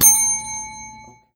Sound effects > Objects / House appliances
BELLHand-Samsung Galaxy Smartphone, CU Desk, Ring 09 Nicholas Judy TDC
A desk bell ringing
bell, desk, Phone-recording, ring